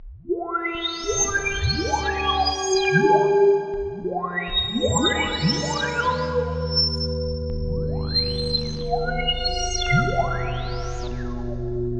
Soundscapes > Synthetic / Artificial
PPG Wave 2.2 Boiling and Whistling Sci-Fi Pads 14
cinematic, content-creator, dark-techno, horror, mystery, noise-ambient, PPG-Wave, sci-fi, scifi, sound-design, vst